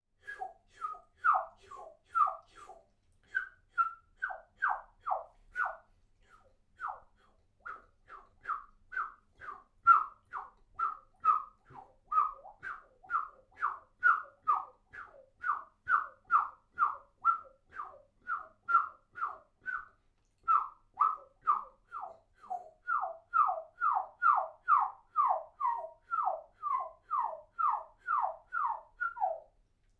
Sound effects > Human sounds and actions
Alien - Cheer 5 Whistling Chirp
alternate, experimental, FR-AV2, individual, indoor, NT5, original, single, weird, XY